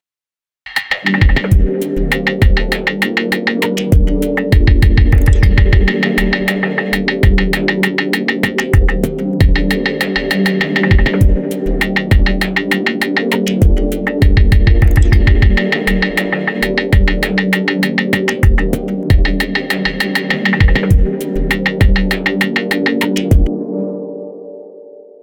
Music > Multiple instruments
Made in FL11, simple, random samples.